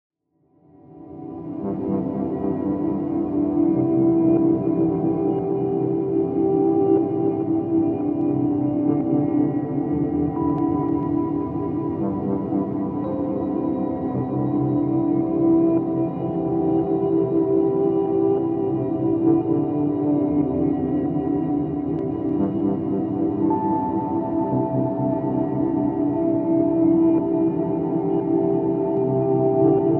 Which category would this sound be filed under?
Music > Other